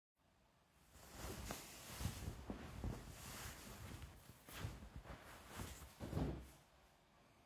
Sound effects > Human sounds and actions
undress hoodie drop
taking of a hoodie/sweeater and dropping it on the floor
takeoff, clothes, sweater